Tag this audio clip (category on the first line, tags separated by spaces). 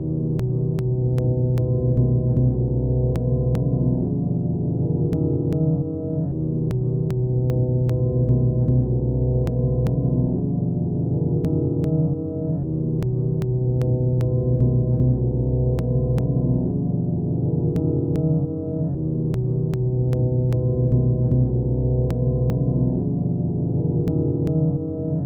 Soundscapes > Synthetic / Artificial
Drum Samples Underground Alien Packs Loopable Soundtrack Loop Dark Ambient Weird Industrial